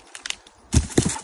Sound effects > Animals
Dog Jumps Off Tree On Grass
Malinois Belgian Shepherd jumping from a tree fork, about 2 meters above ground. Nails scraping on the bark when the dog jumps off. Lands on all fours. Extracted from an iPhone 15 Pro video, cut, normalized using Audacity 3.7.5, uploaded with permission. Probably usable as any animal or human jumping onto grass ground.
landing, dog-jumps-from-tree, grass, dog-jumps-on-grass, animal, land, foot, jumping, feet, animal-jump, jump, dog, lands, canine, footsteps, impact